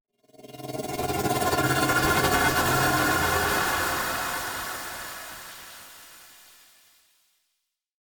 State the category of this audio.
Sound effects > Electronic / Design